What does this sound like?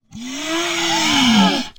Sound effects > Experimental
Creature Monster Alien Vocal FX (part 2)-037
otherworldly, Monster, weird, devil, Creature, Sfx, growl, bite, demon, Alien, grotesque, dripping, mouth, snarl, zombie, gross, fx, howl